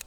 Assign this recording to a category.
Sound effects > Objects / House appliances